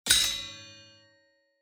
Sound effects > Objects / House appliances
Two Handed Sword Hi3
A sword Hit made with a spoon and a fork recorded with Xiaomi Poco X3 mobile Phone and processed through Studio one via various plugins